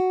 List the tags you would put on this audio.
Instrument samples > String
sound
design
guitar
tone
arpeggio
stratocaster
cheap

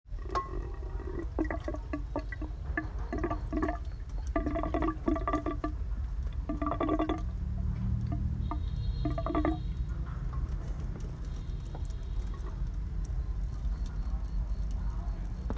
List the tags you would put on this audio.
Sound effects > Objects / House appliances
water
noise
pipe

